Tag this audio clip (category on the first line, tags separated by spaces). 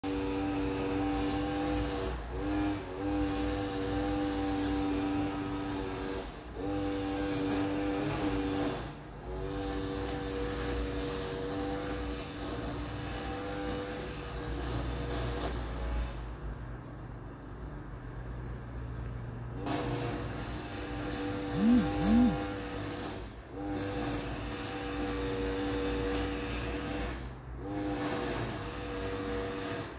Sound effects > Human sounds and actions
mower grass